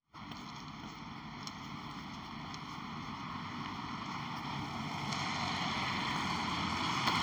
Sound effects > Vehicles
car ppassing 17
Sound of a car passing by in wet, cool weather, with winter tires on the car. Recorded using a mobile phone microphone, Motorola Moto G73. Recording location: Hervanta, Finland. Recorded for a project assignment in a sound processing course.
vehicle, car, drive